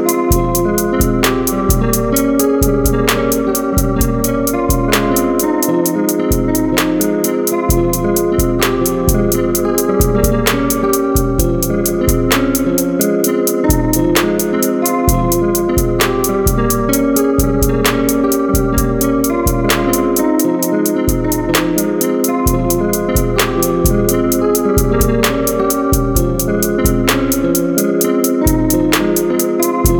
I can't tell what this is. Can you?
Music > Multiple instruments
Slow Piano Trap Idea with 808 130BPM
FL11 Trap idea with piano and tremolo based effects
808, anxious, chorus, 130, tremolo, evil, trap, piano, slow